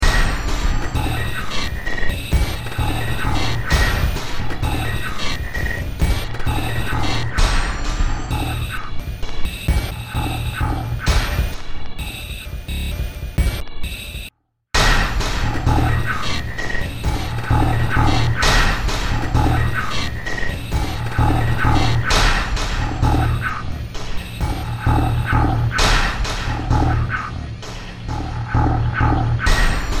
Music > Multiple instruments
Short Track #3871 (Industraumatic)
Ambient, Cyberpunk, Games, Horror, Industrial, Noise, Sci-fi, Soundtrack, Underground